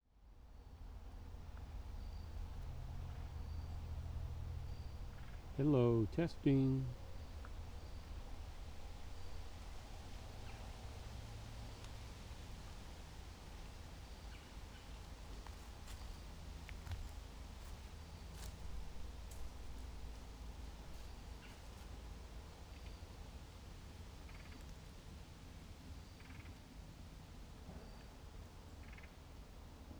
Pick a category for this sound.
Soundscapes > Nature